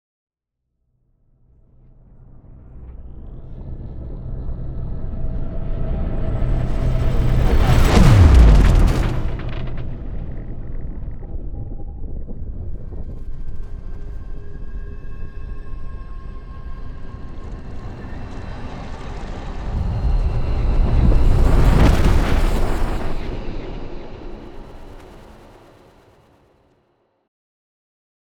Sound effects > Other
Sound Design Elements SFX PS 063
cinematic, implosion, stinger, game, video